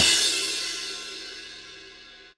Instrument samples > Percussion
crash XWR 7
bang, crash, metal, Zildjian